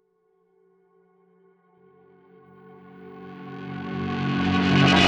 Music > Multiple instruments
Unsettling Crescendo 3
WARNING: These are loud and piercing at the end!
big-crescendo, big-riser, big-swell, cinematic-riser, creepy-crescendo, creepy-riser, crescendo, dramatic-crescendo, dramatic-riser, dramatic-swell, horror-movie, horror-movie-crescendo, horror-movie-riser, horror-riser, increase-volume, intense-crescendo, intense-riser, movie-crescendo, movie-riser, riser, scary-crescendo, spooky-crescendo, unsettling-crescendo, volume-increase